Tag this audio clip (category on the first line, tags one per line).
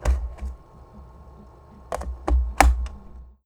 Sound effects > Objects / House appliances
Blue-brand
Blue-Snowball
close
container
foley
lid
open
plastic